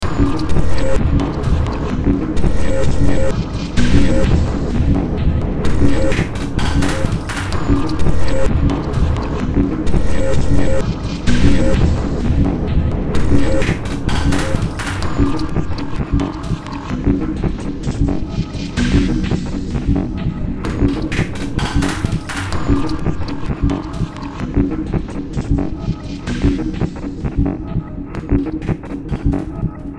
Music > Multiple instruments
Demo Track #3567 (Industraumatic)
Noise, Underground, Industrial, Horror, Games, Sci-fi, Soundtrack, Ambient, Cyberpunk